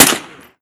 Sound effects > Other mechanisms, engines, machines
Sniper Shot Muffled
Short sniper shot and what might be the bullet casing falling. The rifle appears to be a M110 Semi Automatic Sniper System (M110 SASS). Might need some mixing before use.